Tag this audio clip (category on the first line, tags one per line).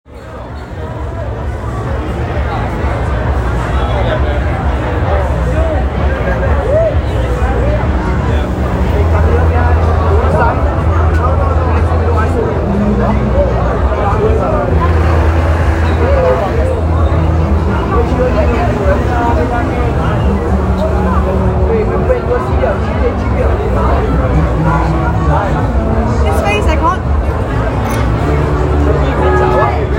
Soundscapes > Urban
ambience
cityscape
fieldrecording
temple
vietnam